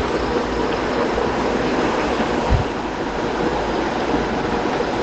Sound effects > Vehicles
tram passing by medium speed2
Tram continuing to pass by in a busy urban environment at a steady medium speed. Recorded from an elevated position near the tram tracks, using the default device microphone of a Samsung Galaxy S20+. TRAM: ForCity Smart Artic X34
tram, transport, urban